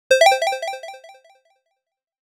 Sound effects > Electronic / Design
GAME UI SFX PRACTICE 9
Program : FL Studio, NES Pulse
sound, command, soundeffect, game, interface, machine, sfx, computer, UI